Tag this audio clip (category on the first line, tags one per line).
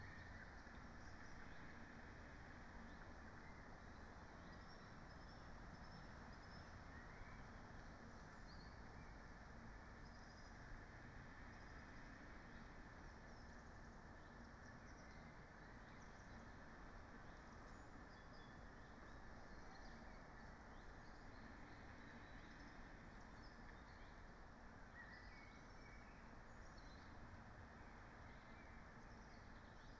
Soundscapes > Nature
sound-installation
Dendrophone
nature
natural-soundscape
data-to-sound
artistic-intervention
modified-soundscape
soundscape
field-recording
weather-data
raspberry-pi
phenological-recording
alice-holt-forest